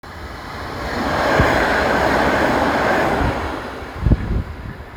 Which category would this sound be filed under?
Soundscapes > Urban